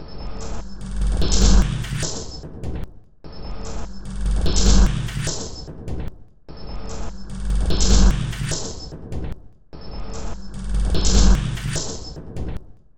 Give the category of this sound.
Instrument samples > Percussion